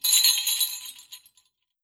Music > Solo percussion
BELLHand-Samsung Galaxy Smartphone, CU Sleigh Bells Jingle, Single Nicholas Judy TDC
Sleigh bells jingle. Single.
bells, jingle, Phone-recording, single, sleigh